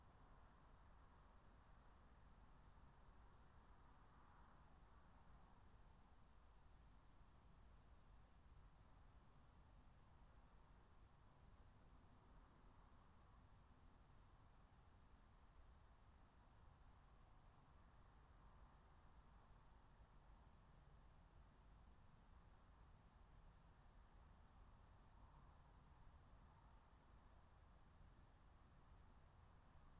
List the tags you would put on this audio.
Soundscapes > Nature
phenological-recording
soundscape
nature
field-recording
natural-soundscape
alice-holt-forest
raspberry-pi
meadow

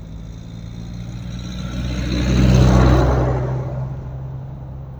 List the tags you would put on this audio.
Sound effects > Vehicles
car
vehicle
automobile

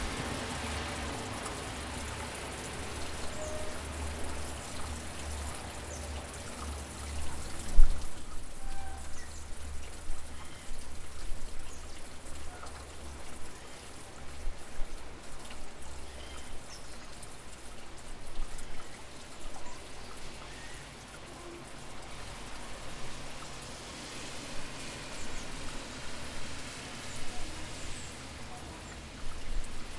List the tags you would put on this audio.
Soundscapes > Nature
rain
small
town

